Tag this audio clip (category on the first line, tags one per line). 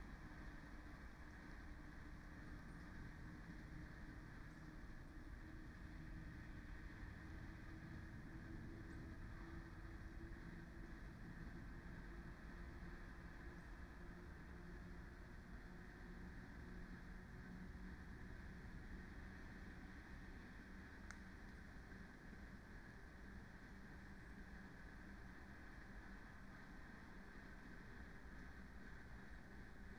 Soundscapes > Nature
Dendrophone
nature
field-recording
raspberry-pi
natural-soundscape
weather-data
alice-holt-forest
phenological-recording
data-to-sound
artistic-intervention
modified-soundscape
sound-installation
soundscape